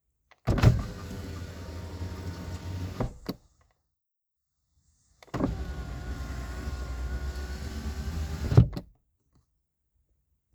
Sound effects > Vehicles
Car Windows Down, Up
Car windows being wound down, then wound up. Motors whirring, window seals clunking. Recorded on the Samsung Galaxy Z Flip 3. Minor noise reduction has been applied in Audacity. The car used is a 2006 Mazda 6A.
electric, close